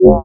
Instrument samples > Synths / Electronic
DISINTEGRATE 4 Gb
additive-synthesis, fm-synthesis